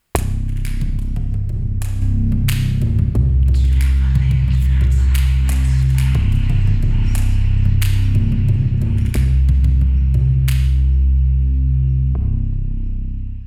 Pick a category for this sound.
Instrument samples > Synths / Electronic